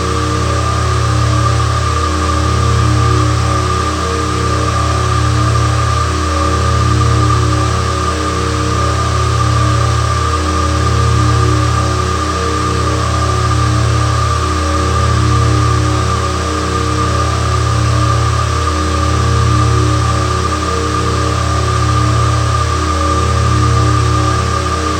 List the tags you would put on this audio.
Soundscapes > Synthetic / Artificial
power
idle
ambience
forcefield
hum
sci-fi
synth
atmospheric
generator
electric
electrical
future
futuristic
sfx
energy
loop
atmosphere
pulsation
shield
ambient
loopable

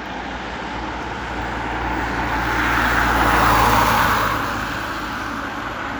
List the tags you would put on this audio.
Soundscapes > Urban
Car
Drive-by
field-recording

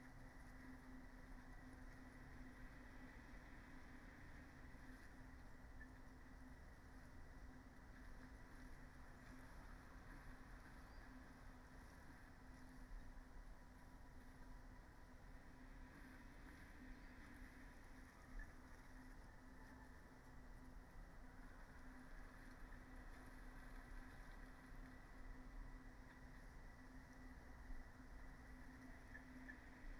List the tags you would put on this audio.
Soundscapes > Nature
sound-installation
soundscape
data-to-sound
raspberry-pi
nature
alice-holt-forest
field-recording
natural-soundscape
modified-soundscape
Dendrophone
phenological-recording
artistic-intervention
weather-data

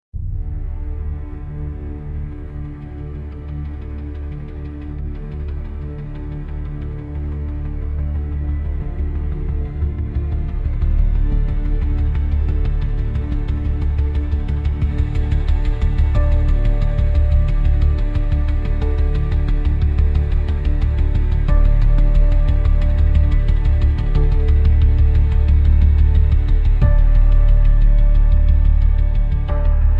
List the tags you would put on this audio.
Music > Multiple instruments
ambient
indiemusic
suspense
horror
indiedev
drama

